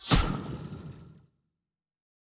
Sound effects > Electronic / Design
Fire Projectile (Organic)
projectile-fire, fire-projectile, fire-quill, flesh-projectile, shoot-laser, organic-projectile-shoot, shoot-organic-projectile, quill-attack, fire-organic-projectile, fire-bony-quill, organic-projecctile, shoot-bone, living-projectile-attack, bone-attack, bone-projectile, organic-projectile-attack, organic-projectile-fire, shoot-flesh-projectile